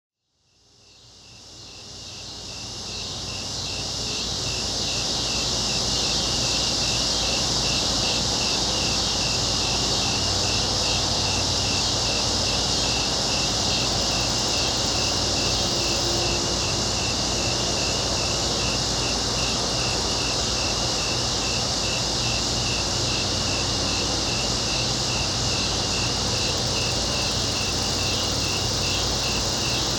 Soundscapes > Nature
Captured on 8-12-25 during a hot summer night.